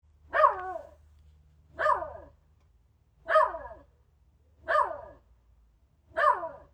Sound effects > Animals
Sounds of my neighbour's dog on 23rd August 2025. Recorded on a Google Pixel 9a phone. I want to share them with you here.